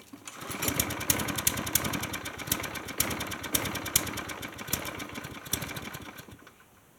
Sound effects > Other mechanisms, engines, machines
oilburner sputter
2007 era Briggs & Stratton Quantum 65 starting, sputtering and stalling. Recorded with my phone.